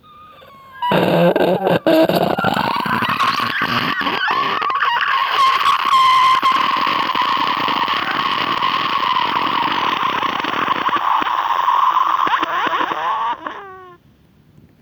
Sound effects > Experimental
Glitchy Ghost Shriek
A loud, piercing shriek of an otherworldly apparition. (or an incredibly bored girl)
creepy, evil, freaky, ghost, growls, horror, shriek